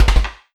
Sound effects > Electronic / Design
Attempt at opening a locked door. The lock and door rattle in their frame, but don't give way. Variation 4.
attempt, door, fail, lock, open, rattling, sealed